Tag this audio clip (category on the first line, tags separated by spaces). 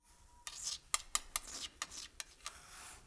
Sound effects > Objects / House appliances
foley glass percussion ceramic perc crystal scrape quartz sfx natural drag tink fx